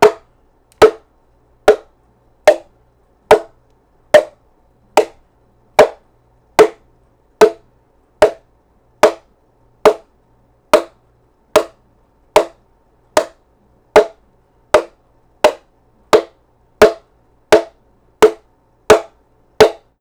Music > Solo percussion
MUSCPerc-Blue Snowball Microphone, CU Bongo, Small, Hit Nicholas Judy TDC
A small bongo drum hit.
Blue-brand, small, Blue-Snowball, hit, bongo, drum